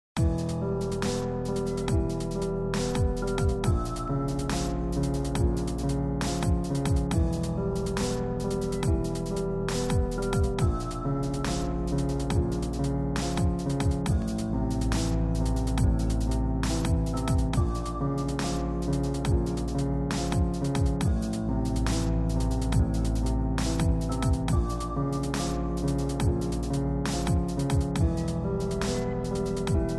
Music > Multiple instruments
music track beats 2
Music song track with beats . This beautiful soundtrack, I hope you like it.